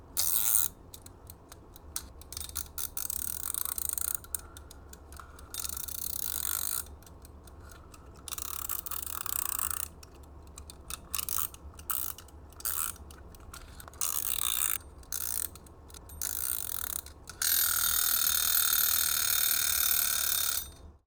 Sound effects > Objects / House appliances
CLOCKMech-Blue Snowball Microphone, MCU Timer, Winding, Bell Ringing Nicholas Judy TDC
A timer winding, then bell ringing.
Blue-brand, Blue-Snowball, ring, timer, wind